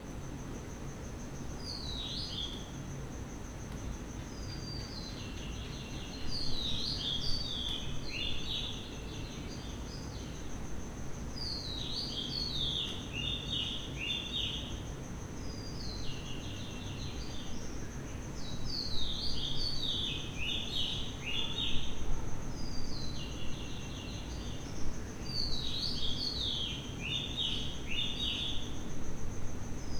Soundscapes > Nature
Oriental Magpie Robin
It’s 4 a.m. in mid-April. In a quiet industrial town in Bengal, India, a Magpie Robin is probably the first to wake, singing softly in the dark. A drop of water hits a plastic shed again and again. A gecko makes a clicking sound. Far-off dogs bark. An airplane passes above. In the distance, a factory hums, and the sound of vehicles from a nearby highway slowly fills the air.
Bengal, Birds, Birdsong, Dawn, Doyel, Field-recording, H1n, India, Magpie-Robin, Nature